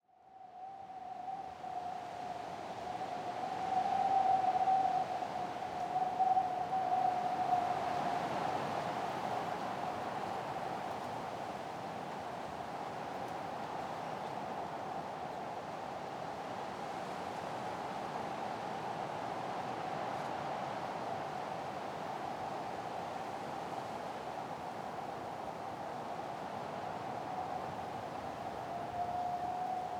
Soundscapes > Nature
blowing wind in forest
antenna, blowing, field-recoding, forest, gale, gust, gusts, nature, trees, wind